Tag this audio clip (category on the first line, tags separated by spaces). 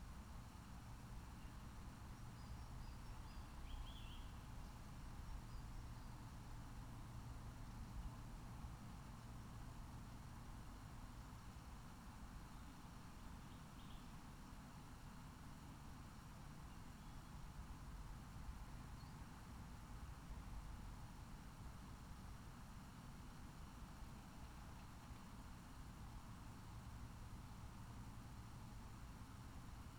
Soundscapes > Nature
soundscape nature alice-holt-forest field-recording natural-soundscape meadow raspberry-pi phenological-recording